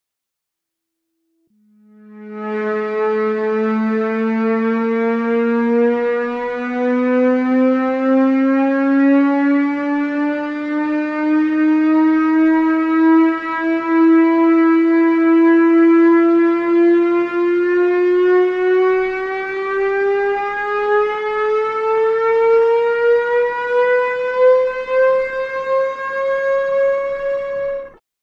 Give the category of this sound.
Sound effects > Electronic / Design